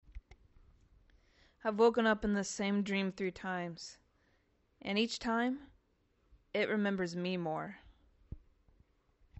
Speech > Solo speech
“Dream Loop” (surreal / sci-fi / subconscious)
A haunting, dreamy script exploring lucid dreams or looping time with a sinister twist.
dream, loop, Script, surreal